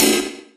Instrument samples > Percussion
A wild rockride built with overcompressed jazzrides. I merged and low-pitched old ridefiles of mine (see my ride folder).
Zildjian, ridebell, Agean, bell, Mehmet, Paiste, Sabian, bassbell, cup, bellcup, Hammerax, Amedia, Diril, cymbal, Soultone, cymbell, Bosphorus, crashcup, Meinl, Stagg, metal-cup, Istanbul, ride, ping, cupride, bellride, Crescent, click-crash, Istanbul-Agop